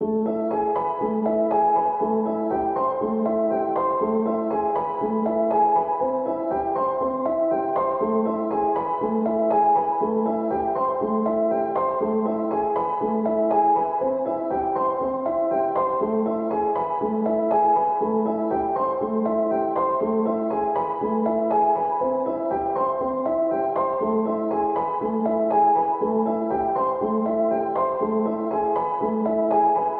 Music > Solo instrument
Piano loops 193 efect 4 octave long loop 120 bpm
120, 120bpm, free, loop, music, piano, pianomusic, reverb, samples, simple, simplesamples